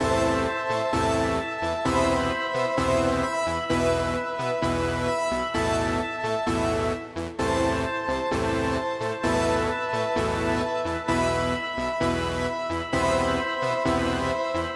Music > Multiple instruments
Trap Type Synth Chord Loop
140 bpm chord loop with synth. Good for trap
Hiphop, Loop, 140, Synth, Arp, bpm, Grime, Jungle, Chord, Trap, Garage